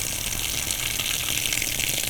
Sound effects > Objects / House appliances
Faucet running with hum loop
Faucet running with hum from the pressure.
faucet, flow, flowing, Hose, hum, leak, Outdoor, pressure, running, stream, water